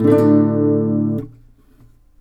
Music > Solo instrument
acoustic guitar pretty chord 5
dissonant, twang, chord, chords, strings, acosutic, slap, string, knock, riff, guitar, solo, pretty, instrument